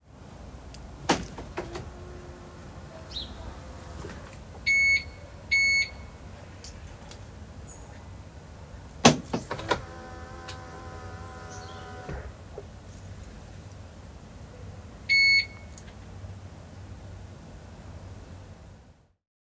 Sound effects > Objects / House appliances
Car Lock Key Door Open And Close (Opel Mokka) (2024) (Disarm) (Alarm) [Beep 2 Times)
Recorded with an iPad Pro 2021, And made With Audacity. Car Lock Key Door Open And Close with Alarm Using Opel Mokka
alarm, automobile, car, carkey, cars, close, door, drive, engine, field-recording, lock, motor, opel, opelmokka, sensor, start, vehicle